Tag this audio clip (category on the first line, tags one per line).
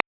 Sound effects > Electronic / Design
Clicks
Electronic
Glitch